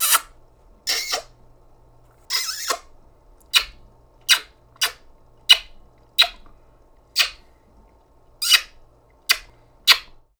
Sound effects > Human sounds and actions
HMNKiss-Blue Snowball Microphone, CU Many Nicholas Judy TDC
human, lips, Blue-Snowball, Blue-brand, kiss